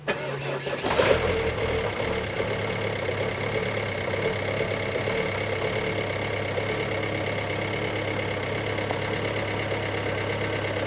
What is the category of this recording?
Sound effects > Vehicles